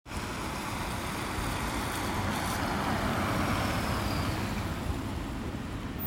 Sound effects > Vehicles
A car passing by from distance on Lindforsinkatu 2 road, Hervanta aera. Recorded in November's afternoon with iphone 15 pro max. Road is wet.
rain, tampere